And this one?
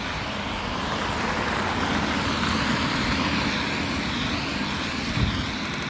Sound effects > Vehicles
Car driving 4
engine, road, hervanta, outdoor, car, drive, tampere